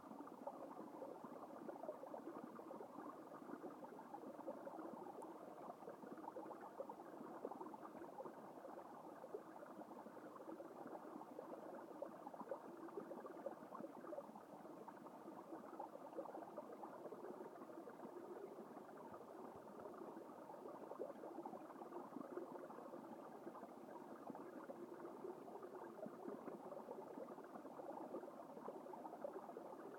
Soundscapes > Nature
Underground water Jiptik
16/08/25 - Alay Mountains, Kyrgyzstan Recording of a stream flowing under the rocks. Sadly a lot of self-noise from microphone Zoom H2N
mountains, river, stream, water